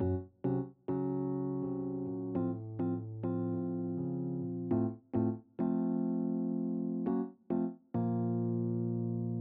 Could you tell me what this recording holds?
Music > Solo instrument
Apple A Day Vintage Keys 2 - 102BPM A# Minor
Vintage keys melody in A# minor at 102 BPM. Made using the Vintage Keys pack for Spitfire LABS in REAPER. Second of two parts.